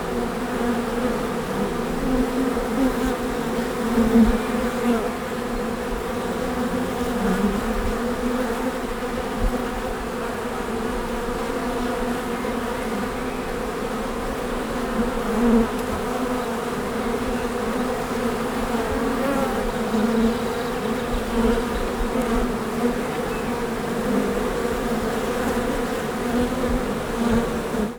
Soundscapes > Nature
In the bee box2- swarm of bees mono
Very close to the beehive... Only got one stitch :-) "sum, sum, sum" Some birds in the background.
hive, bee, swarm, buzzing, buzz, bees, beehive, hum, insects